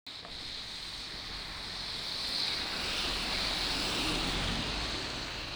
Sound effects > Vehicles
tampere bus20
bus, transportation, vehicle